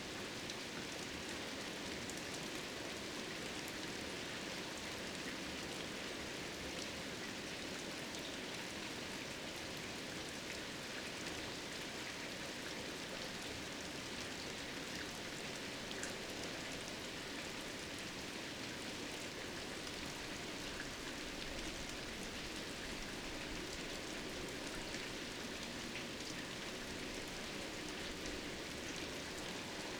Soundscapes > Nature
Autumnal medium rain. Tascam PortaX8 (A/B config) and Behringer C2 stereo bar